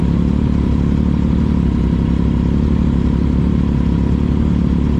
Sound effects > Other mechanisms, engines, machines
clip prätkä (7)
Motorcycle, Supersport, Ducati